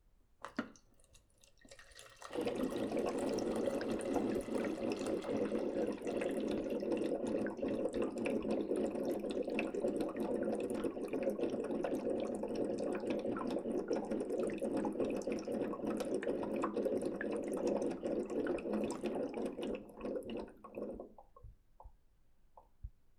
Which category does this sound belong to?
Soundscapes > Other